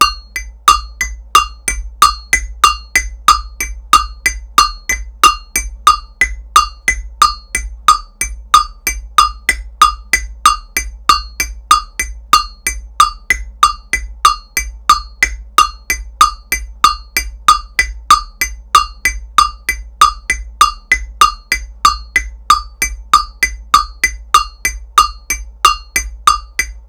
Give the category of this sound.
Music > Solo percussion